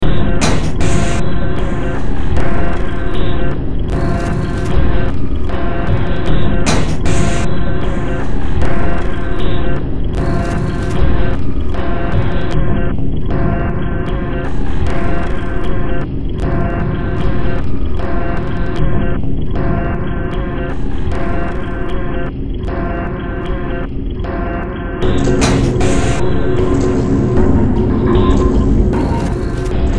Music > Multiple instruments
Demo Track #3265 (Industraumatic)
Ambient, Cyberpunk, Games, Horror, Industrial, Noise, Sci-fi, Soundtrack, Underground